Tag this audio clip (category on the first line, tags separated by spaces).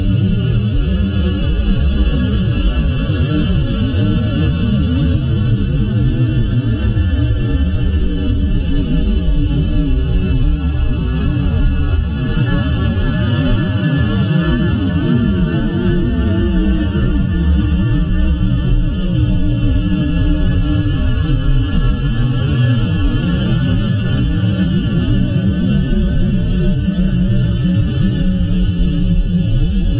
Sound effects > Experimental
bells mumbling ringing muffled